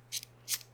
Sound effects > Other
LIGHTER FLICK 12
zippo, lighter, flick